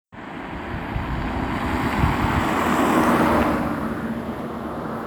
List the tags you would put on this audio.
Sound effects > Vehicles
wet-road moderate-speed asphalt-road car passing-by studded-tires

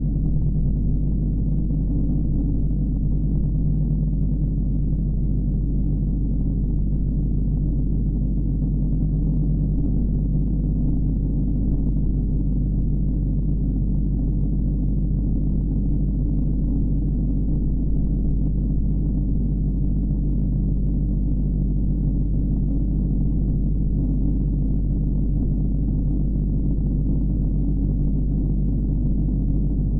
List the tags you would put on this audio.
Sound effects > Experimental
LOM INTERIOR SCIFI SPACESHIP

